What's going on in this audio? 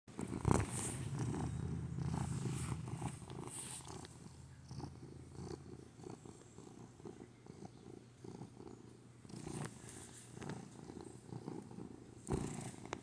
Sound effects > Animals
House Cats - Tom Cat, Purr
Tom cat purrs.
cat, kitty, pet-cat, purr, purring, tom-cat